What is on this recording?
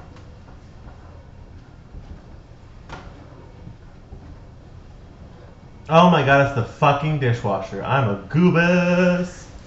Sound effects > Other
Sound Problem Solved
Pay no mind to the bad sound tech, it mostly just had good comedic timing and I wanted to share.
Blooper, Misery